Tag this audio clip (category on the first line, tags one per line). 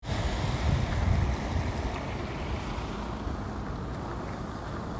Sound effects > Vehicles
auto
traffic
field-recording
car
city
street